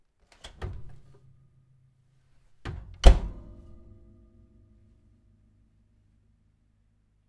Sound effects > Objects / House appliances
Opening and closing oven door
Subject : A Oven Door opening/closing Date YMD : 2025 04 Location : Gergueil France Hardware : Tascam FR-AV2 and a Rode NT5 microphone in a XY setup. Weather : Processing : Trimmed and Normalized in Audacity. Maybe with a fade in and out? Should be in the metadata if there is.
2025, closing, Dare2025-06A, FR-AV2, hinge, indoor, NT5, opening, oven, oven-door, Rode, Tascam